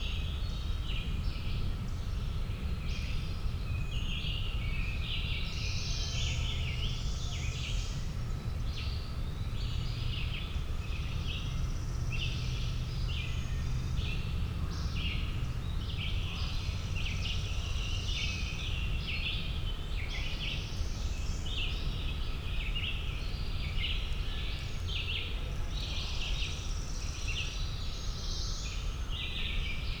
Soundscapes > Nature
AMBForst-Summer Morning Hiking Trail in National Forest, birdsong, passing hiker, 9AM QCF Mammoth Cave Sony M10
A Hiking Trail in Mammoth Woods National Park, Summer Morning, 9AM, birdsong, passing hiker.
bird, birds, birdsong, field-recording, forest, nature, summer, woods